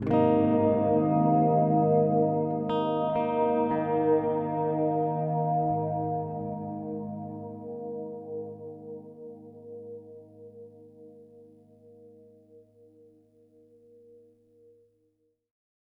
Instrument samples > String
Baritone Guitar - G# Chord (Back and forth) - Reverb
Simple chord played on my G4M electric baritone guitar that is tuned in C. Recorded with Dreadbox Raindrops effects pedal on Zoom AMS-24 audio interface (stereo).
baritone, chord, electric, Gsharp, guitar, reverb, stereo, wide